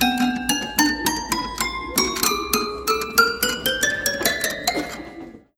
Music > Solo instrument
MUSCToy-Samsung Galaxy Smartphone, CU Piano, Run Up Nicholas Judy TDC
A toy piano running up. Recorded at Goodwill.
Phone-recording, run, toy-piano, up